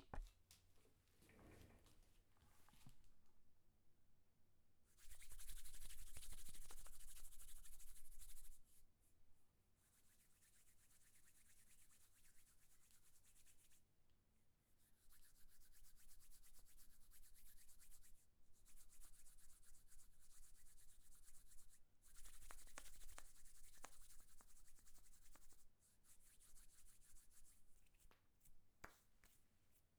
Sound effects > Human sounds and actions
Hands and Clothes
Rubbing my hands at different speeds and from diverse distances. Then, lifting and dropping my arms, you can hear my clothes as I move. Recorded with a Zoom H4n.
clothing, foley, hands